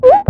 Sound effects > Human sounds and actions
Click Sound
click, clicking, click-sound, clicksound